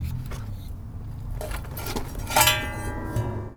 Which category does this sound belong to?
Sound effects > Objects / House appliances